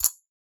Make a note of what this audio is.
Sound effects > Objects / House appliances
Jewellerybox Shake 2 Shaker
Shaking a ceramic jewellery container with the contents inside, recorded with an AKG C414 XLII microphone.
trinket-box, jewellery, jewellery-box